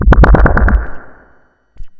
Instrument samples > Synths / Electronic
Benjolon 1 shot12
NOISE, ELECTRONICS, 1SHOT, CHIRP, DRUM, BENJOLIN, SYNTH, DIY